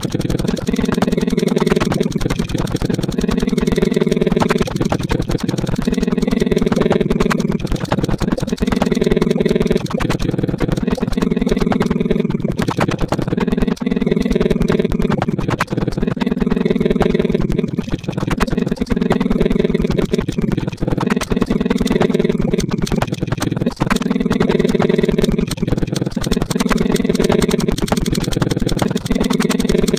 Sound effects > Experimental
Strange Regular Signal - Granular Noise - GrainStorm
Chopped and bended signals combined into one. Five of my own recordings (spray can, putting down an object, water in bottle, closing a window, voice recording) with the voice being the predominant one.
alien, corrupted, glitch, glitched, glitchy, GrainStorm, granular, loop, looping, repeating, repeating-message, sci-fi, seamless, seamlessly, signal, strange, weird